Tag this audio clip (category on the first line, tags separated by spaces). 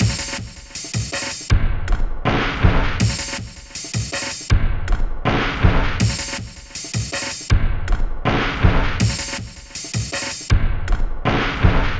Instrument samples > Percussion
Weird Dark Underground Loop Alien Drum Loopable Industrial Samples Packs Ambient Soundtrack